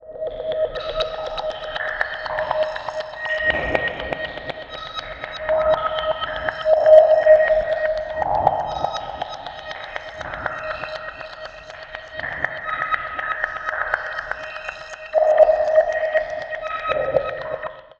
Instrument samples > Percussion
Esoteric Drum Loop at 120bpm
A drum loop made with esoteric sounds and my beloved Digitakt 2